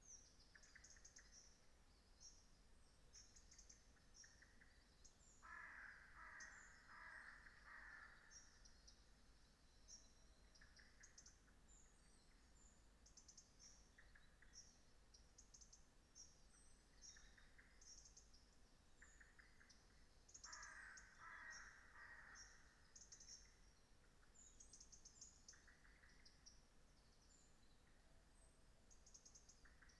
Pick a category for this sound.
Soundscapes > Nature